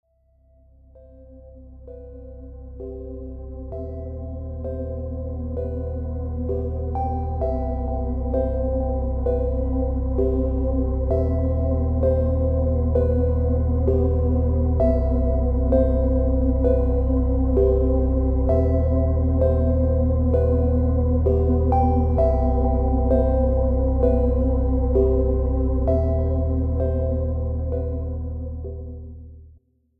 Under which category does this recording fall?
Music > Multiple instruments